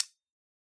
Sound effects > Other mechanisms, engines, machines
Upside-down circuit breaker switch-006
When it's upside-down the switch reproduce a slightly different sound, a bit dryer and with a shorter release time. There are also samples in the pack that attend the antithesis. Please follow my socials, don't be rude..
percusive, foley, recording, sampling, click